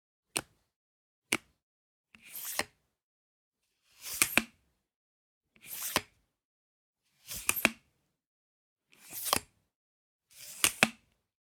Sound effects > Objects / House appliances
Tripod Leg Unlock/Extend C
Extending and retracting a tripod. Recorded with a Zoom H2n, using only the mid microphone (single cardioid condenser)
camera, extend, lock, monopod, protract, retract, selfie, selfiestick, stick, tripod, unlock